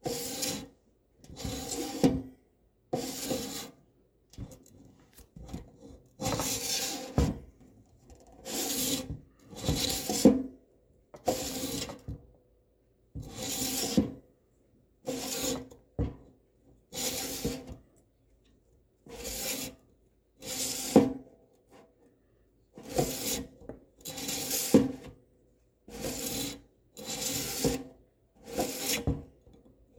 Sound effects > Other mechanisms, engines, machines
COMAv-Samsung Galaxy Smartphone, CU Seerite 6X6 Opaque Projector, Focus, Slide In, Out Nicholas Judy TDC
A Seerite 6X6 Opaque projector sliding focus in and out.
slide, out, seerite-6x6-opaque, foley